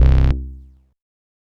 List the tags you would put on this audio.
Instrument samples > Synths / Electronic
bass synth vst

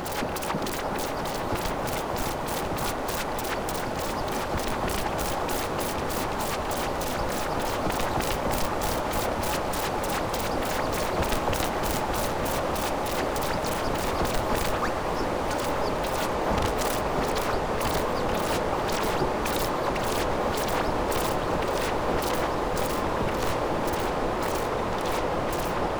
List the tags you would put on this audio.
Sound effects > Electronic / Design
commons free noise sci-fi scifi sound-design

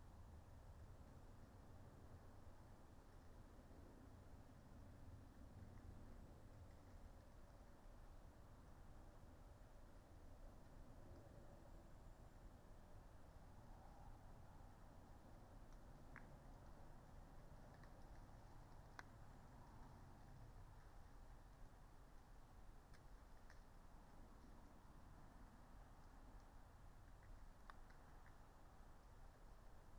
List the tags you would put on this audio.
Soundscapes > Nature
data-to-sound artistic-intervention phenological-recording natural-soundscape weather-data nature raspberry-pi field-recording soundscape Dendrophone modified-soundscape alice-holt-forest sound-installation

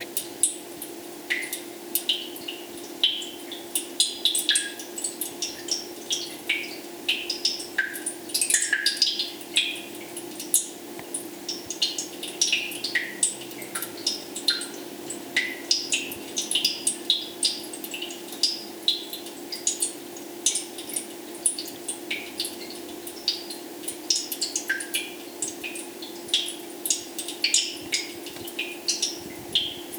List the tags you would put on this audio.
Sound effects > Experimental
Contact Droplets ContactMicrophone Heater Water Drops

